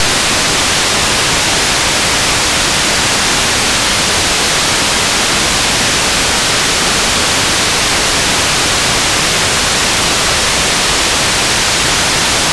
Instrument samples > Synths / Electronic
NOISE Waldorf Pulse 2
Noise Oscillator - Waldorf Pulse 2